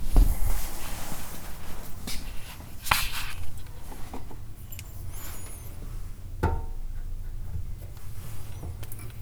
Sound effects > Other mechanisms, engines, machines
metal shop foley -240
bam, bang, boom, bop, crackle, foley, fx, knock, little, metal, oneshot, perc, percussion, pop, rustle, sfx, shop, sound, strike, thud, tink, tools, wood